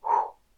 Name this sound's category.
Sound effects > Human sounds and actions